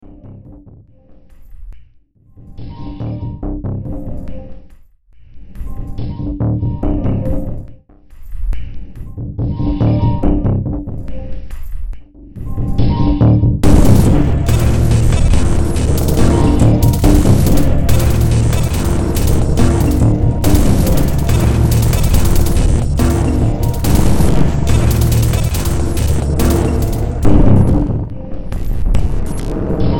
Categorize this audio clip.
Music > Multiple instruments